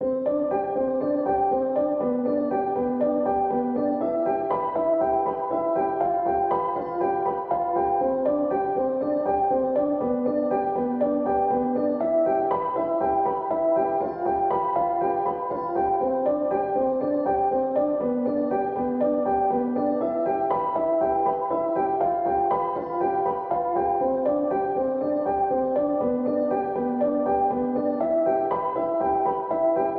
Music > Solo instrument
Piano loops 171 efect 4 octave long loop 120 bpm
120, piano, pianomusic, simple, simplesamples